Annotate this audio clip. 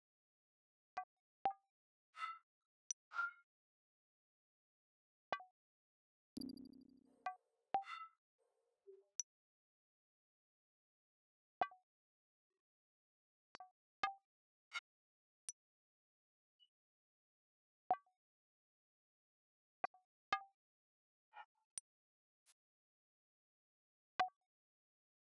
Instrument samples > Percussion
Made with Opal by Fors. Some kind of sticks and other organic type sounds.